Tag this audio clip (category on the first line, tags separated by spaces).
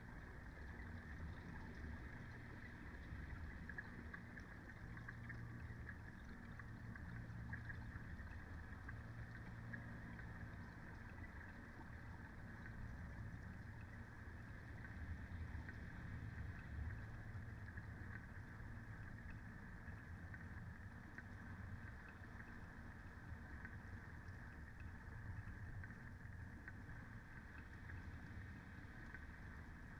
Soundscapes > Nature
field-recording weather-data Dendrophone